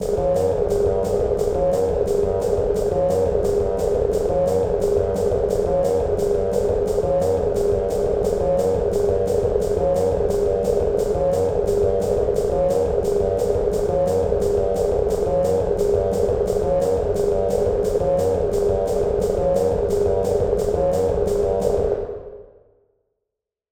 Music > Solo percussion
Simple Bass Drum and Snare Pattern with Weirdness Added 020
Bass-and-Snare, Bass-Drum, Experimental, Experimental-Production, Experiments-on-Drum-Beats, Experiments-on-Drum-Patterns, Four-Over-Four-Pattern, Fun, FX-Drum, FX-Drum-Pattern, FX-Drums, FX-Laden, FX-Laden-Simple-Drum-Pattern, Glitchy, Interesting-Results, Noisy, Silly, Simple-Drum-Pattern, Snare-Drum